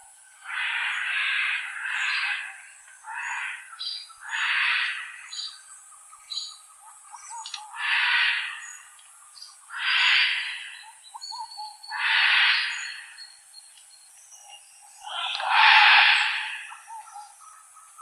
Soundscapes > Nature
Amazon Forest - A wild population of red-and-green macaws (Ara chloropterus)
Soundscape recorded in the Amazon rainforest, highlighting vocalizations produced by a free-ranging group of red-and-green macaws (Ara chloropterus) in their natural habitat. - Recording date: March 12, 2023 - 14PM - Recorder: Savetek, model GS-R07 - Microphone: Superlux, model ECM888B - measurement mic - Uruará, State of Pará, Brazil, GPS: -3°31´35´´S -53°46´21´´W Citation: ARAUJO, R. L. Amazon Forest - A wild population of red-and-green macaws - Uruará - Pará - Brazil.
Bioacoustics, Bird, Birdsong, Free, Tropical